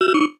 Sound effects > Electronic / Design
Simple Chirp
A delightful lil chime/ringtone, made on a Korg Microkorg S, edited and processed in Pro Tools.
bleep, korg, electronic, ui, beep, ringtone, synth, chirp, click, microkorg, computer, gui, blip, sfx, game